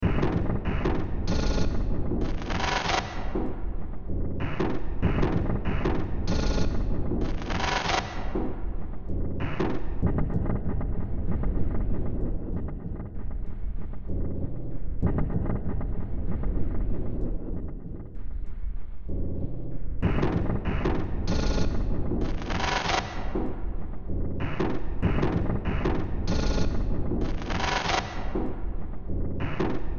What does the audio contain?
Music > Multiple instruments
Demo Track #3846 (Industraumatic)
Horror, Cyberpunk, Underground, Noise, Industrial, Games, Sci-fi, Soundtrack, Ambient